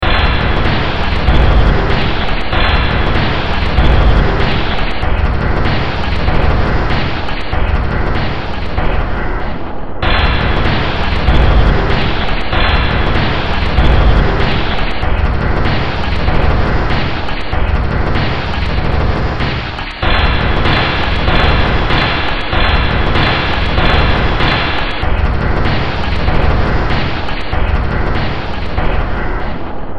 Music > Multiple instruments
Short Track #4005 (Industraumatic)
Ambient, Soundtrack, Underground, Games, Sci-fi, Industrial, Horror, Cyberpunk, Noise